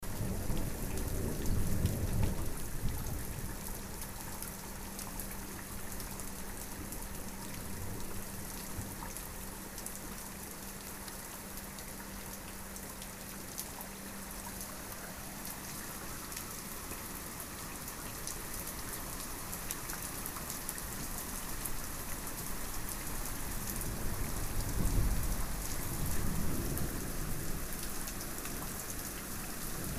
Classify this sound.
Soundscapes > Nature